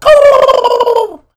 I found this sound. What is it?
Sound effects > Animals
TOONAnml-Blue Snowball Microphone, CU Turkey Gobble, Comedic, Vocal Nicholas Judy TDC
A comedic turkey gobble. Recorded using my voice.
Blue-Snowball, ocellated-turkey, gobble, thanksgiving, vocal, Blue-brand, comedic, wild-turkey